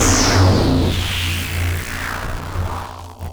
Instrument samples > Synths / Electronic
CVLT BASS 60
lfo, subs, synthbass, sub, wavetable, synth, drops, subbass, bass, bassdrop, subwoofer, stabs, clear, wobble, low, lowend